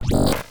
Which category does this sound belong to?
Sound effects > Electronic / Design